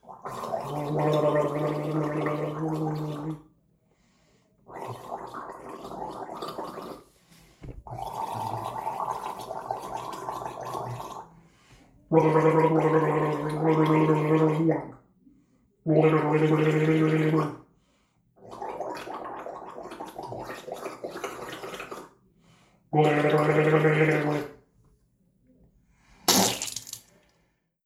Sound effects > Objects / House appliances
HMNMisc-Samsung Galaxy Smartphone Gargles, Various, Spit into Sink, After Brushing Teeth Nicholas Judy TDC
Gargling and spitting in the sink at the end.
gargle, human, Phone-recording, sink, spit, voice